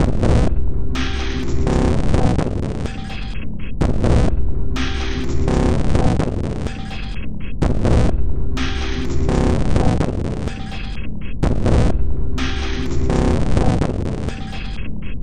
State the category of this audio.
Instrument samples > Percussion